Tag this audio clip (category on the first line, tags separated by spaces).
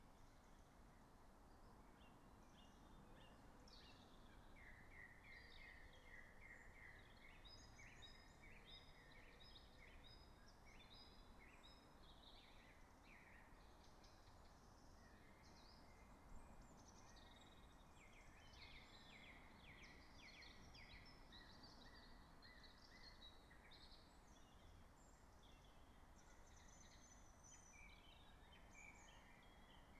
Soundscapes > Nature
modified-soundscape sound-installation alice-holt-forest weather-data raspberry-pi field-recording soundscape Dendrophone natural-soundscape artistic-intervention nature data-to-sound phenological-recording